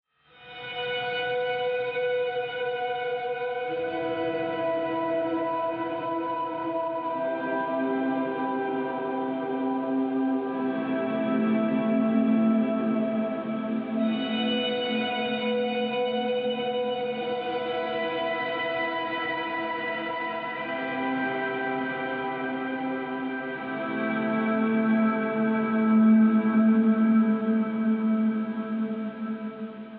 Music > Solo instrument
Ambient Guitar - Post Rock - Shoegaze - Dreampop - fender Jazzmaster mexico
guitar, echo, dreamy, shoegaze, dreamlike